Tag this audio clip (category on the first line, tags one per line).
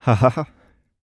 Speech > Solo speech
Generic-lines
Shotgun-microphone
FR-AV2
hahaha
VA
Adult
MKE600
laughing
Male
MKE-600
Single-mic-mono
Sennheiser
Voice-acting
july
Hypercardioid
Tascam
2025
Shotgun-mic
mid-20s
Calm